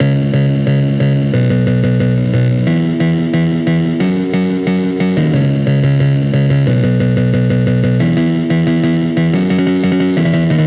Music > Solo instrument
rave bass - Sewerpvsher
a slap bass loop made for my song "Sewerpvsher", in 4/4 time, at 180 bpm, and in G# min
180bpm, slapbass, loop, Gsharpmin, 4-4